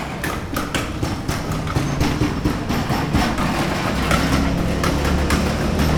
Sound effects > Other mechanisms, engines, machines
Road sweeper’s rattling cart
A road sweeper or ‘barrendero’ pushes his cart along a road in Oaxaca, Mexico, making a rhythmic rattle over cobblestones as it goes. Recoded in Oaxaca, Mexico, on a hand-held Zoom H2 in July 2023.
city, field-recording, Mexico, people, street